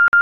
Sound effects > Electronic / Design
UV-5RM "power on" sfx
created in audacity with sine wave generator, listened to frequency using android app spectroid the radio usually makes this sound when first starting it and voice is enabled